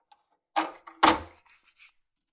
Sound effects > Other mechanisms, engines, machines
Dewalt 12 inch Chop Saw foley-050
Shop, Metallic, Chopsaw, Saw, Perc, Circularsaw, Workshop, Metal, Foley, Blade, Woodshop, Tooth, FX, SFX, Percussion, Scrape, Teeth, Tools, Tool